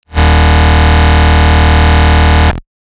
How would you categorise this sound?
Instrument samples > Synths / Electronic